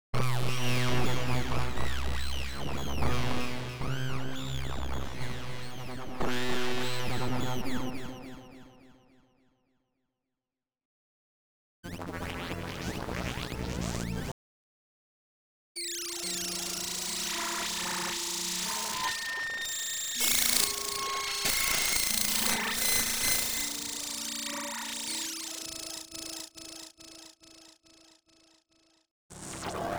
Sound effects > Electronic / Design
metamorph synth glitch alien zoundz
Sequences of glitchy alien tones and fx created with Metamorph and other vst effects in FL Studio and further processed with Reaper
alien, ambience, analog, bass, creature, creepy, dark, digital, experimental, extraterrestrial, fx, glitch, glitchy, gross, industrial, loopable, machanical, machine, monster, otherworldly, sci-fi, sfx, soundeffect, sweep, synthetic, trippy, underground, warped, weird, wtf